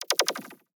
Sound effects > Electronic / Design
ROS-FX One Shoot 2
Synthed with Oigrandad 2 granular. Sample used from bandlab.